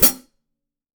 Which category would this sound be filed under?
Music > Solo instrument